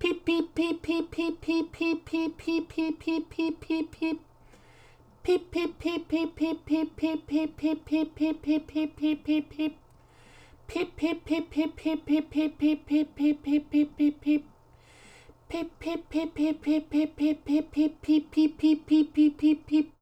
Sound effects > Animals
A chick peeping. Human imitation. Cartoon.